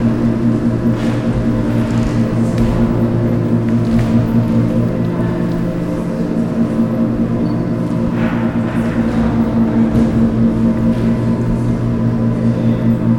Soundscapes > Indoors
Fan Ventilator Engine - Biennale Exhibition Venice 2025
The sound of the engine of a ventilator fan Sound recorded while visiting Biennale Exhibition in Venice in 2025 Audio Recorder: Zoom H1essential
field-recording, museum, engine, exhibition, fan, ventilator, biennale, rotor, noise, industrial, machine